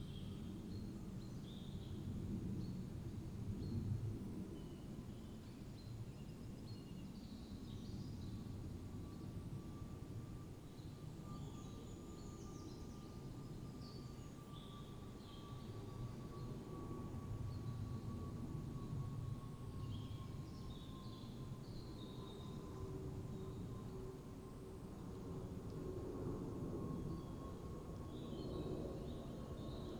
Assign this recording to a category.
Soundscapes > Nature